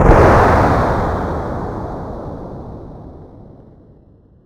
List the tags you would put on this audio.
Sound effects > Electronic / Design
8-bit retro Game Boom SFX video-game FX Explosion

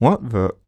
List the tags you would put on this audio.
Speech > Solo speech
confused dialogue FR-AV2 Human Male Man Mid-20s Neumann NPC oneshot singletake Single-take surprised talk Tascam U67 Video-game Vocal voice Voice-acting what

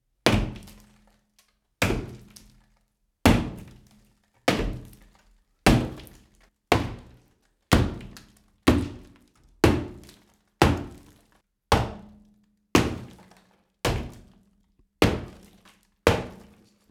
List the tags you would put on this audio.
Sound effects > Objects / House appliances
construction continous debris drywall hard hitting sledgehammer stonewall variation

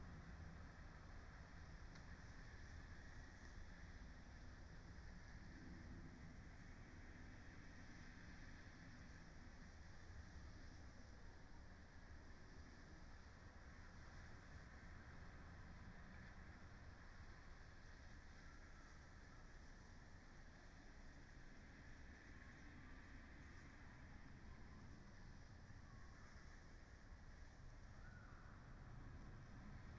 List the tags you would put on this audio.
Soundscapes > Nature
alice-holt-forest weather-data phenological-recording artistic-intervention soundscape natural-soundscape raspberry-pi field-recording modified-soundscape nature data-to-sound sound-installation Dendrophone

